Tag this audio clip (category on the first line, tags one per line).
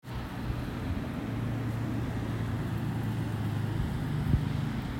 Sound effects > Vehicles
auto
car
city
field-recording
street
traffic